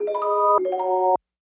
Music > Other
Xmas Miracle
Calm, warm sounds from an Xmas bell! Produced on a Korg Wavestate, mastered at -3dBu in Pro Tools.
bell, bump, bumper, chime, effect, game, jingle, motif, sfx, sound-design, soundeffect, sound-logo, stinger, ui